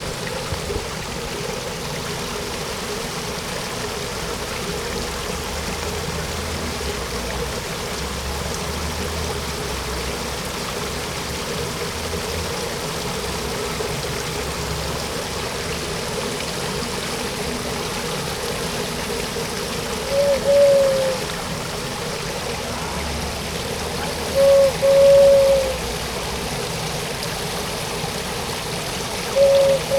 Soundscapes > Urban
20251024 ParcCanBatllo Nature Water Nice Relaxing
Urban Ambience Recording in collab with EMAV Audiovisual School, Barcelona, November 2026. Using a Zoom H-1 Recorder.
Nature, Nice, Relaxing, Water